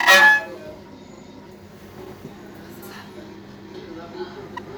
Sound effects > Objects / House appliances
shot-metalscrape-01
Scraping and bowing metal sheets